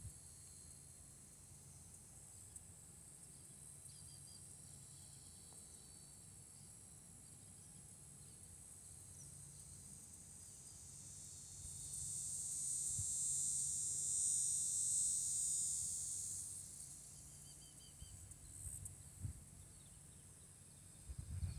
Soundscapes > Nature
Ambience - Afternoon Ambience with Insects and Brown-headed Nuthatch
A short and sweet afternoon ambience recorded with an LG Stylus 2022 with insects and a brown-headed nuthatch.
nuthatch, insect